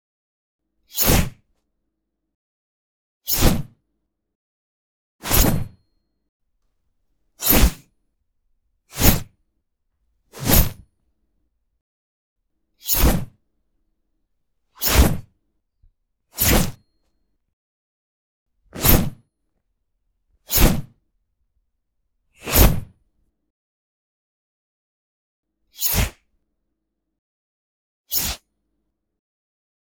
Sound effects > Objects / House appliances
TMNT 2012 rwby or DMC inspired sword swing w heavy textured whoosh and scrapes FINAL 06112025
sounds of heavy exaggerating sword swing sounds inspired by tmnt 2012 episode "Clash of the Mutanimals"; "Owari"; and "Scroll of the Demodragon", RWBY "Adam character short (2018)", "Argus Limited, and "Amity Arena", and Devil may cry video game franchise. can be used for when characters launch heavy sword attacks and beast men claw swipping. sounds that I used: scraping noises: knife slide on carving knife. silverware fork slide on carving knife. whooshes: texture: rainproof jacket (nylon.)
exaggerated, fight, martialarts, swipe, kung-fu, combat, swords, fighting, battle, weapon, knight, karate, nylon, swoosh, anime, claw, swing